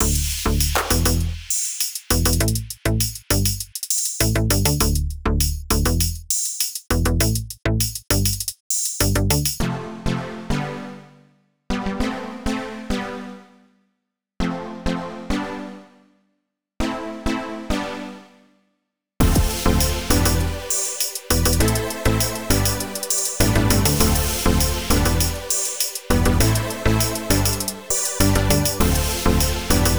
Music > Other
Simple beat mix
Simple Hip Hop rap beat that can be repeated